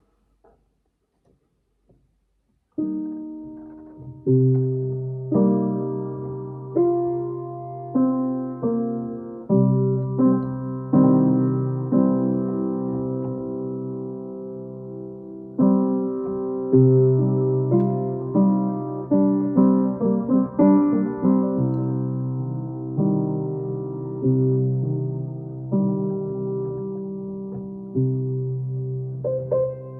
Music > Solo instrument
250425 piano recording
Part of a piano impro Recorded on a phone